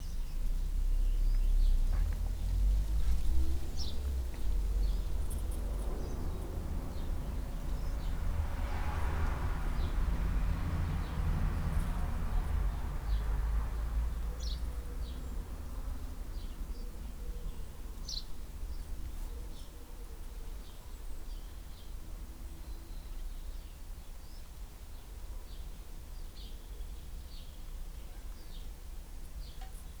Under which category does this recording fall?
Soundscapes > Nature